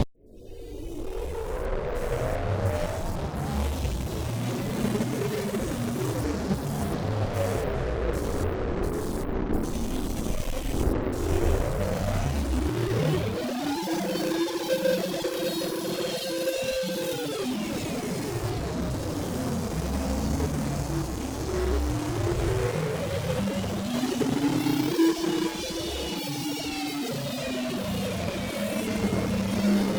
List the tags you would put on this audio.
Soundscapes > Synthetic / Artificial
alien
ambience
ambient
atmosphere
bass
bassy
dark
drone
effect
evolving
experimental
fx
glitch
glitchy
howl
landscape
low
roar
rumble
sfx
shifting
shimmering
slow
synthetic
texture
wind

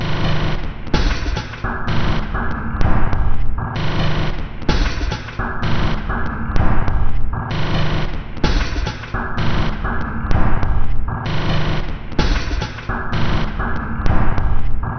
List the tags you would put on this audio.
Instrument samples > Percussion
Loop
Dark
Drum
Samples
Loopable
Industrial

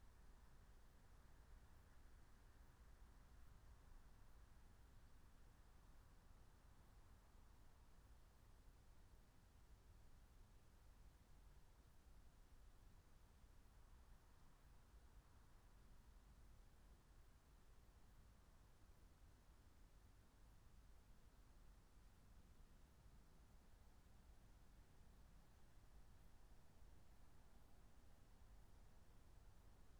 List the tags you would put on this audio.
Soundscapes > Nature
phenological-recording
natural-soundscape

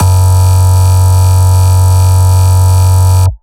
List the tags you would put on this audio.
Instrument samples > Synths / Electronic
EDM
Dubstep
Subbass
Sub
Bass

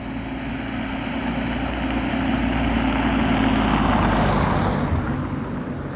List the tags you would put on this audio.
Sound effects > Vehicles
asphalt-road
car
moderate-speed
passing-by
studded-tires
wet-road

